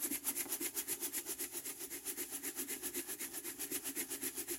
Sound effects > Objects / House appliances
TOYMisc-Samsung Galaxy Smartphone Etch A Sketch, Shaking, Erasing, Looped Nicholas Judy TDC
An etch-a-sketch shaking while erasing. Looped.